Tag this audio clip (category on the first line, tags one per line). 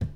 Sound effects > Objects / House appliances
bucket
carry
clang
clatter
cleaning
container
debris
drop
fill
foley
garden
handle
hollow
household
kitchen
knock
lid
liquid
metal
object
pail
plastic
pour
scoop
shake
slam
spill
tip
tool
water